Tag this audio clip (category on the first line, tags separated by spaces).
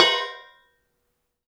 Music > Solo instrument
Metal GONG Ride Oneshot Paiste FX Sabian Perc Kit Cymbals Hat Drums Custom Percussion Drum Cymbal Crash